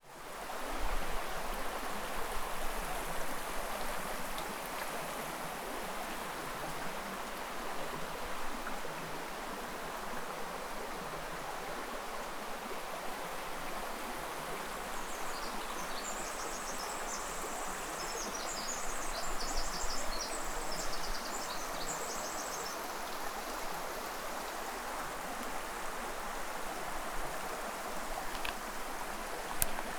Soundscapes > Nature
A light brook rushing through Fern Canyon in the Redwoods of Northern California. Recorded with a Tascam d-05 field recorder